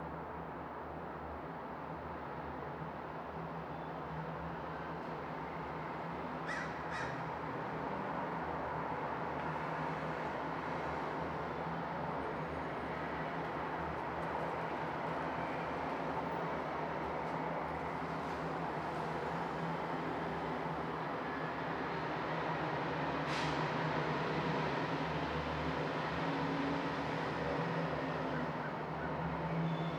Soundscapes > Urban
A garbage truck arrives to collect the refuse from a large dumpster and then leaves.
garbage, ambience, field-recording, noise, city, traffic, dumpster, urban